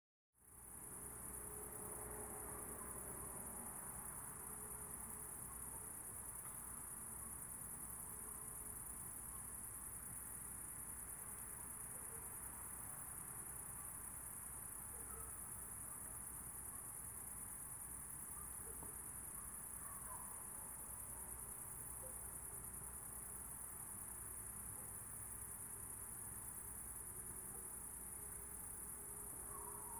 Soundscapes > Nature
The sounds of crickets, distant road, wind and dogs. Gear: - Tascam DR100 Mk3 - Rode NT4